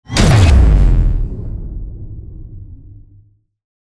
Sound effects > Other
Sound Design Elements Impact SFX PS 036
impact, percussive, hit, cinematic, explosion, strike, crash, heavy, hard, smash, sharp, rumble, shockwave, transient, design, sfx, collision, blunt, force, thudbang, audio, game, power, sound, effects